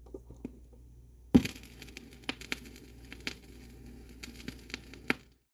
Sound effects > Objects / House appliances
COMPhono-Samsung Galaxy Smartphone Vinyl Record, Needle Down, Groove Noise Nicholas Judy TDC
A vinyl record needle down and groove noise.
down, record, needle, Phone-recording, groove, noise, vinyl